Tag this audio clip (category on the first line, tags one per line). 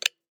Sound effects > Human sounds and actions
button
switch
activation
off
toggle
interface
click